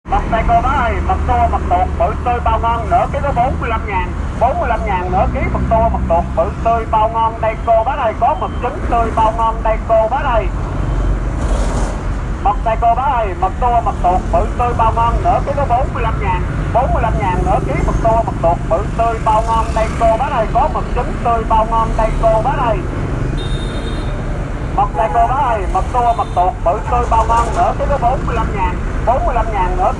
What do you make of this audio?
Speech > Solo speech
Bắt Tai Cô Bác Ơi, Mực Tua Bạch Tuột, Bự Tươi Bao Ngon
Man sell squid say 'Bắt tai cô bác Ơi! Mực tua bạch tuột, bự tươi bao ngon, nửa ký 45 ngàn, 45 ngàn nửa ký, mựa tua bạch tuộc, bự tươi bao ngon đây cô bác ơi! Có bằng chứng tươi bao ngon đây cô bác ơi!'. Record use iPhone 7 Plus 2025.08.26 16:24